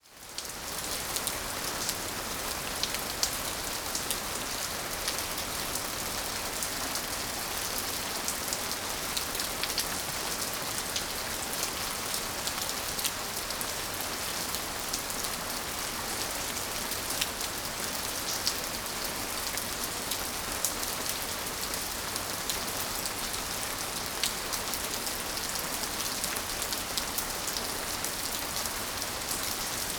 Soundscapes > Other
RAIN Summer Rain.City Yard.Entrance Canopy.Eaves Leaking.Drops On Plants And Asphalt 3 EM
A sound recording made during heavy rain in the courtyard of a multi-storey apartment building in an old residential area. Recorded under the canopy of the entrance. Water pours from the eaves of the roof and falls onto the blind area and lawn. The courtyard is located between two apartment buildings, so you can hear the corresponding reverberation. I hope you enjoy it. Recorded June 13 , 2025 on Tascam DR-05x, with post processing. Location: Ekaterinburg City (Russia). I ask you, if possible, to help this wonderful site stay afloat and develop further. Enjoy it! I hope that my sounds and phonograms will be useful in your creativity. Note: audio quality is always better when downloaded.
town, rainfall, sound, shower, drops, heavy, field-recording, downpour, yard, white-noise, noise, ambience, environment, ambient, weather, courtyard, city, thunderstorm, raining, dripping, meditation, atmosphere, rain, background